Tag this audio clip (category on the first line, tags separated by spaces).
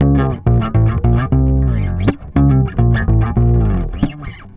Instrument samples > String
fx riffs slide loop bass electric rock loops pluck charvel mellow funk oneshots blues plucked